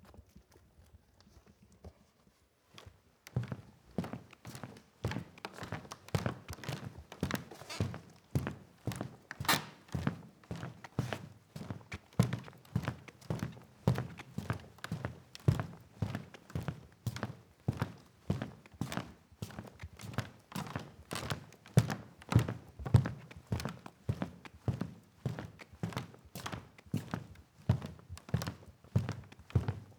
Sound effects > Human sounds and actions
Footsteps on a wooden floor in the "Paranthoën" big room of the Logelloù artistic center, Penvénan, Bretagne. Faster pace, heavy leather shoes. Mono recording, MKH50 / Zoom F8.
feet, footsteps, logellou, parquet, shoes, steps, walk, walking, wood, wooden-floor